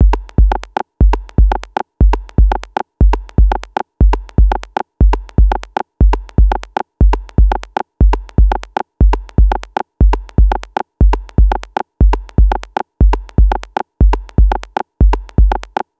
Music > Solo percussion
Organic Drum Loop 120bpm #001
This is a drum loop created with my beloved Digitakt 2. I like its organic simple sound.
120-bpm, 120bpm, beat, drum, drum-loop, drums, loop, neat, organic, percussion, percussion-loop, rhythm, Thermionic